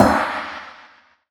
Instrument samples > Percussion
cheapgong fake 1d shorter
A crashgong to be used in metal/rock/jazz music. Shortened version of the namesake soundfile. tags: crashgong gongcrash ride crash China sinocymbal cymbal drum drums crash-gong gong gong-crash brass bronze cymbals Istanbul low-pitched Meinl metal metallic Sabian sinocymbal Sinocymbal smash Soultone Stagg synthetic unnatural Zildjian Zultan
sinocymbal, Sabian, smash, brass, metal, ride, crash-gong, Meinl, Soultone, cymbals, Zildjian, China, cymbal, unnatural, crashgong, drums, Stagg, gong, gong-crash, metallic, bronze, gongcrash, Istanbul, low-pitched, Zultan, synthetic, drum, crash